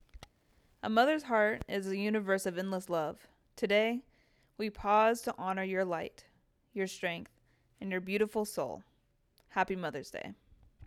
Speech > Solo speech
Poetic Mother's Day Message – Deep and Emotional
An emotional, poetic Mother's Day voice-over, crafted for deeper, touching projects where you want to leave a lasting impact. Script: "A mother’s heart is a universe of endless love. Today, we pause to honor your light, your strength, and your beautiful soul. Happy Mother’s Day."